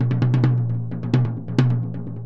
Music > Solo percussion
med low tom-fill harder 12 inch Sonor Force 3007 Maple Rack
perc, drums, beat, flam, quality, roll, drum, oneshot, drumkit, real, maple, loop, Tom, tomdrum, recording, toms, percussion, Medium-Tom, realdrum, wood, med-tom, kit, acoustic